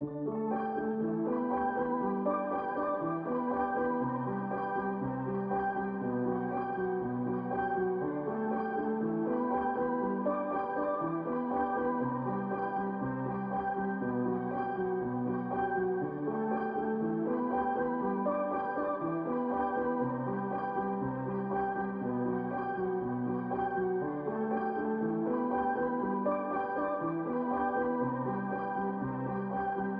Music > Solo instrument
Piano loops 184 efect 2 octave long loop 120 bpm
120, 120bpm, free, loop, music, piano, pianomusic, reverb, samples, simple, simplesamples